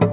Music > Solo instrument
Acoustic Guitar Oneshot Slice 55
chord, foley, notes, plucked, sfx, twang